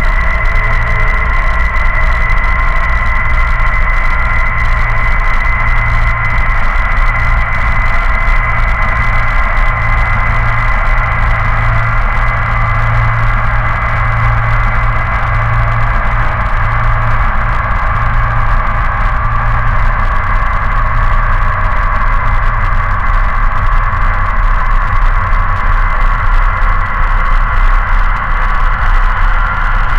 Sound effects > Experimental
"Even after traveling hundreds of miles, an eerie feeling of being watched haunted me." For this upload I recorded ambient noises in my home using a Zoom H4n multitrack recorder. Those files were then imported into Audacity where I applied various effects and modulations to shape the media into a final sound file.